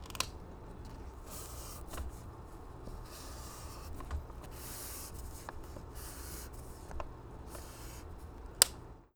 Sound effects > Objects / House appliances
OBJWrite-Blue Snowball Microphone, MCU Highlighter, Cap Off, Highlight, Cap On Nicholas Judy TDC
A highlighter cap off, highlighting and cap on.
off, Blue-Snowball, cap, Blue-brand, foley, highlight, highlighter